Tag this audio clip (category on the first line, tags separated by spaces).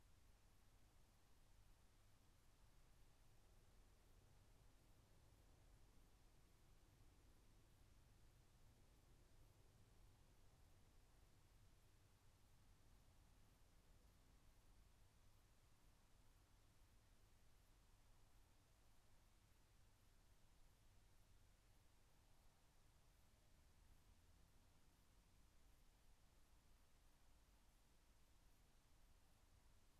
Soundscapes > Nature
alice-holt-forest field-recording meadow natural-soundscape nature phenological-recording raspberry-pi soundscape